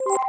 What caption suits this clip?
Sound effects > Electronic / Design
Digital interface SFX created using in Phaseplant and Portal.